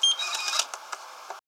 Sound effects > Other mechanisms, engines, machines
Beep Receipt Perc 2
computer, digital, cashier, receipt, beep, beeping